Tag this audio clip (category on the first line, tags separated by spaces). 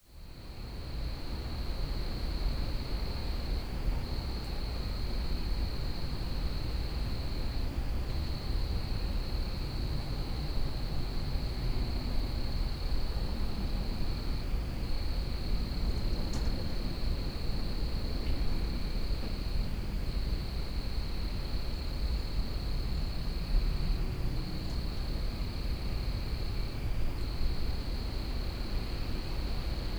Soundscapes > Nature
Crickets Field-recording Nature Night Peaceful Spring Wind